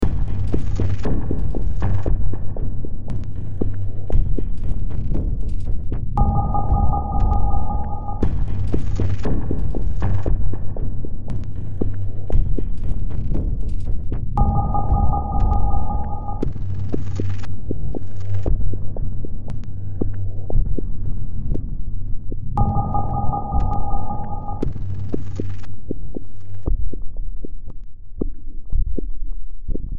Music > Multiple instruments
Demo Track #4011 (Industraumatic)
Cyberpunk, Sci-fi, Industrial, Noise, Underground, Horror, Games, Soundtrack, Ambient